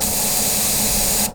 Sound effects > Objects / House appliances
AIRHiss-Blue Snowball Microphone, CU Aerosol Can Nicholas Judy TDC
An aerosol can hiss.
aerosol, Blue-Snowball, hiss, Blue-brand, can